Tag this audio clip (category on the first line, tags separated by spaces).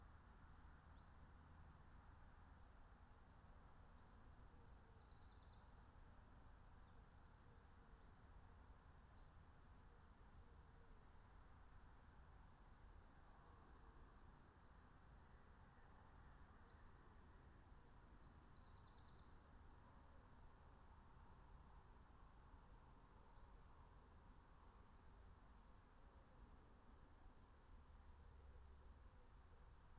Soundscapes > Nature
alice-holt-forest field-recording meadow natural-soundscape nature raspberry-pi